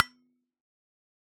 Sound effects > Objects / House appliances
Dry coffee thermos-001
percusive, recording